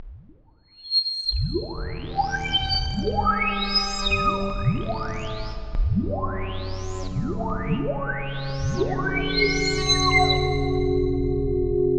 Soundscapes > Synthetic / Artificial
PPG Wave 2.2 Boiling and Whistling Sci-Fi Pads 12
This is a dark pad and ambient pack suitable for sci-fi, horror, mystery and dark techno content. The original 20 samples were made with Waldorf PPG Wave 2.2 vst, modeled after the hardware synth. They include both very high and very low pitches so it is recommended to sculpt out their EQ to your liking. The 'Murky Drowning' samples are versions of the original samples slowed down to 50 BPM and treated with extra reverb, glitch and lower pitch shifting. The, 'Roil Down The Drain' samples are barely recognizable distorted versions of the original samples treated with a valve filter and Devious Machines Infiltrator effects processor. The, 'Stirring The Rhythms' samples were made by loading up all the previous samples into Glitchmachines Cataract sampler.
cinematic, content-creator, dark-design, dark-soundscapes, dark-techno, drowning, horror, mystery, noise, noise-ambient, PPG-Wave, science-fiction, sci-fi, scifi, sound-design, vst